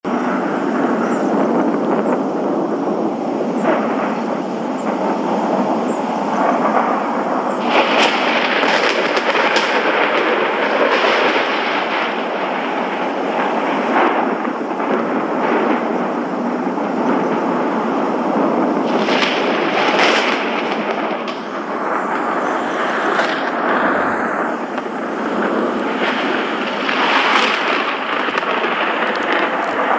Soundscapes > Nature
Thunder & Lightning Storm
Field Recording of severe weather, thunder & Lightning.
Rain, Lightning, Field-recording, Storm, Thunder-storm, Weather